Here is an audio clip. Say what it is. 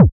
Instrument samples > Percussion
8 bit-Kick1
8-bit, FX